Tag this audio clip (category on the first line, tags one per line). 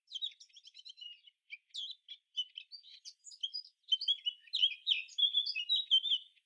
Sound effects > Animals
Bird
blackcap
birds
nature